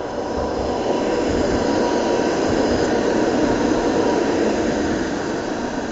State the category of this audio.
Soundscapes > Urban